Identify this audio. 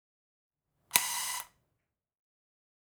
Sound effects > Other mechanisms, engines, machines
MapGasTorch GasOnly V01
The sound of gas flowing from a torch without ignition. Useful as a continuous mechanical layer or for building tension.